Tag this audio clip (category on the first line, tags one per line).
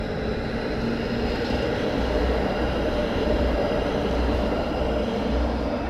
Sound effects > Vehicles
Finland
Public-transport
Tram